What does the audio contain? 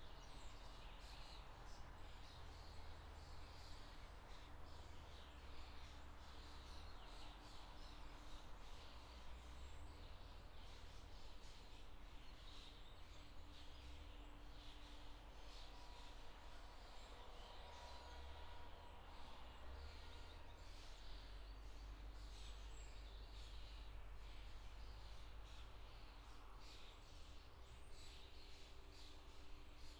Soundscapes > Urban
AMBSubn Street cleaner and small murmuration of starlings near the suburbs, Karlskrona, Sweden
Recorded 09:48 03/06/25 It’s actually on a forest trail but closeby is a road and parking lot that gets cleaned back and forth by a street sweeper. While the murmuration is constant, the sweeper sometimes goes away to another road. The forest also has other birds and in the beginning there’s some short hammering. Zoom H5 recorder, track length cut otherwise unedited.
Murmuration, Sweeper, Sweden, Morning, Karlskrona, Bergsa, Starlings, Suburbs, Noisy, Street, Field-Recording, Road, Cleaner